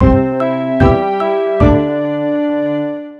Music > Multiple instruments
150bpm Instruments: Melodica, Orchestral hit, Synth xylophone.